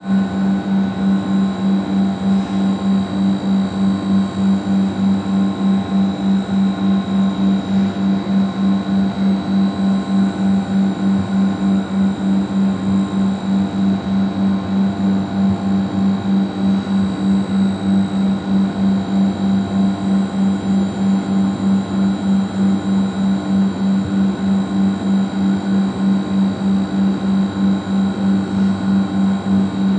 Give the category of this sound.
Soundscapes > Urban